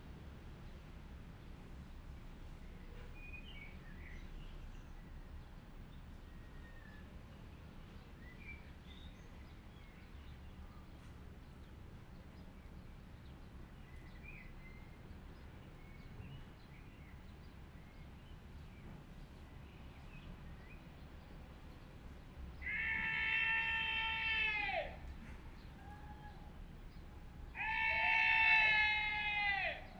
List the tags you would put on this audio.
Soundscapes > Other
anti cruise ships shouting tourism protest norway

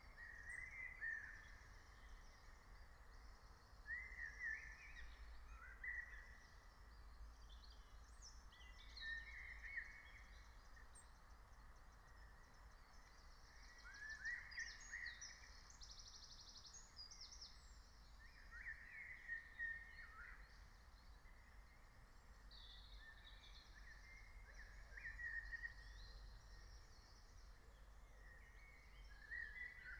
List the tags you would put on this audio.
Soundscapes > Nature
nature alice-holt-forest raspberry-pi natural-soundscape soundscape field-recording

